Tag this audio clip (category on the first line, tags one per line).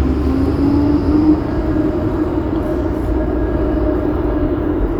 Sound effects > Vehicles
tramway
vehicle